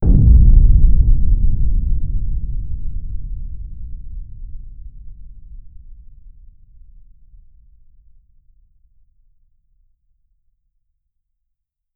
Sound effects > Other
A impact sound I have made. I used a Samson microphone to record it. It's a Metalplate with much editing until I was okay with the editing. Used Pitch, Stretch and Lowpassfilter.